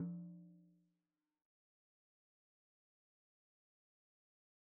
Music > Solo percussion
Hi Tom- Oneshots - 19- 10 inch by 8 inch Sonor Force 3007 Maple Rack
oneshot, rim, tom, acoustic, beats, toms, velocity, perc, percussion, hi-tom, percs, fill, roll, kit, beatloop, rimshot, flam, instrument, tomdrum, hitom, beat, drums